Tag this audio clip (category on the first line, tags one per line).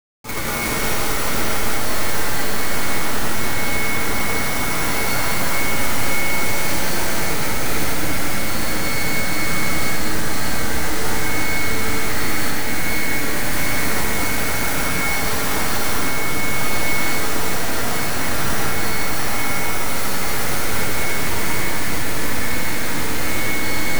Soundscapes > Synthetic / Artificial
effects
electronic
experimental
free
glitch
granulator
noise
packs
royalty
sample
samples
sfx
sound
soundscapes